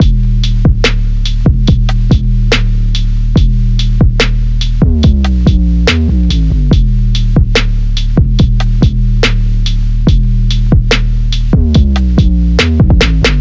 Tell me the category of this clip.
Music > Solo percussion